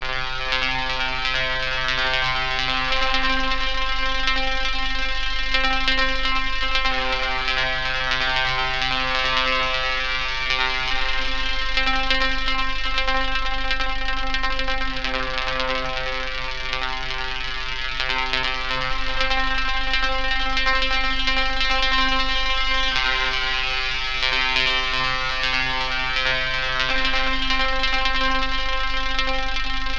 Sound effects > Electronic / Design
Detuned Electromagnetic Noise with Elastic Combo Filter Loop 120 bpm #001
120-bpm, 120bpm, electric, electrical, electromagnetic, field, field-recording, loop, magnetic, noise